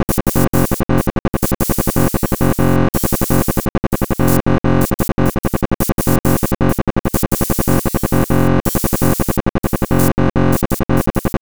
Instrument samples > Percussion
This 168bpm Drum Loop is good for composing Industrial/Electronic/Ambient songs or using as soundtrack to a sci-fi/suspense/horror indie game or short film.
Soundtrack, Loop, Underground, Weird, Ambient, Loopable, Drum, Packs, Alien, Industrial, Samples, Dark